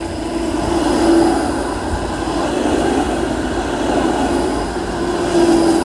Sound effects > Vehicles
tram rain 10
motor, tram, rain